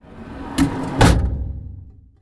Sound effects > Other mechanisms, engines, machines
Closing the metal drawer 004
A crisp, resonant recording of a metal drawer being closed. Recorded with minimal background noise, the sound is ideal for use in Foley, sound design, ambiance creation, and mechanical transitions.
sliding, furniture, drawer, push, Closing, metal, storage, cabinet, handle, compartment, pull, noise, clank, shut, action, container, mechanical, latch, close